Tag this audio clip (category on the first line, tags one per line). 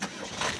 Sound effects > Vehicles
automobile
car
engine
ignition
motor
start
vehicle